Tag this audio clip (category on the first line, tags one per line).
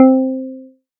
Instrument samples > Synths / Electronic
additive-synthesis
fm-synthesis